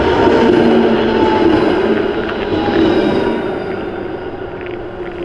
Sound effects > Vehicles
Tram00051888TramPassingSpeedingDown
Tram passing by decreasing speed before its stop. Recorded during the winter in an urban environment. Recorded at Tampere, Hervanta. The recording was done using the Rode VideoMic.
city, vehicle